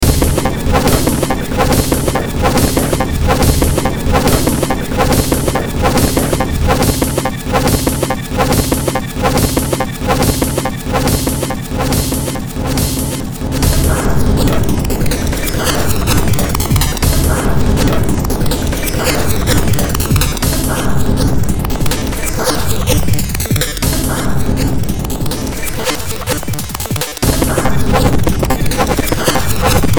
Music > Multiple instruments
Short Track #2956 (Industraumatic)
Ambient, Horror, Sci-fi, Underground